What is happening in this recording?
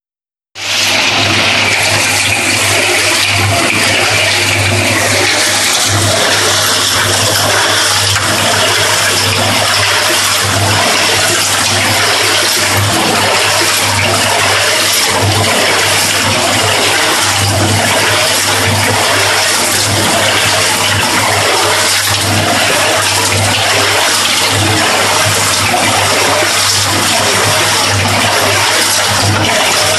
Sound effects > Vehicles
concrete mixer recorded at mobil phone
noise, telephone, phone